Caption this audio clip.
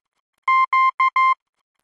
Sound effects > Electronic / Design
A series of beeps that denote the letter Q in Morse code. Created using computerized beeps, a short and long one, in Adobe Audition for the purposes of free use.
Language, Morse, Telegragh